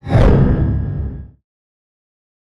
Sound effects > Other
Sound Design Elements Whoosh SFX 013
design, motion, production, elements, ambient, movement, audio, dynamic, trailer, cinematic, sound, film, effect, swoosh, fast, element, transition, effects, fx, whoosh, sweeping